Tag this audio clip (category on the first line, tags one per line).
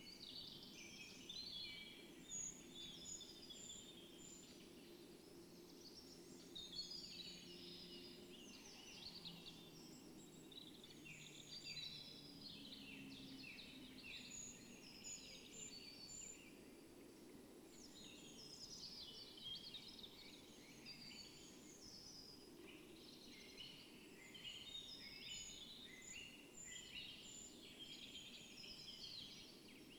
Soundscapes > Nature
alice-holt-forest
Dendrophone
modified-soundscape
sound-installation
soundscape